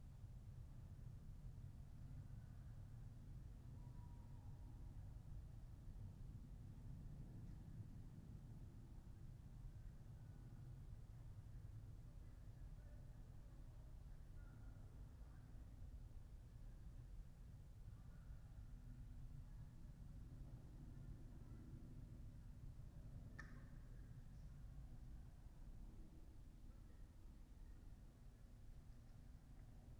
Soundscapes > Nature
Dendrophone is a site-specific sound installation by Peter Batchelor located in Alice Holt Forest, Surrey, UK, that transforms local environmental data into immersive sound textures. These recordings are made directly from the installation’s multichannel output and capture both its generative soundscape and the ambient natural environment. The sounds respond in real-time to three key ecological variables: • Humidity – represented sonically by dry, crackling textures or damp, flowing ones depending on forest moisture levels. • Sunlight energy – conveyed through shifting hissing sounds, juddery when photosynthetic activity is high, smoother when it's low. • Carbon dioxide levels – expressed through breathing-like sounds: long and steady when uptake is high, shorter and erratic when it's reduced. The installation runs on a DIY multichannel system based on Raspberry Pi Zero microcomputers and low-energy amplifiers.